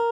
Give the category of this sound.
Instrument samples > String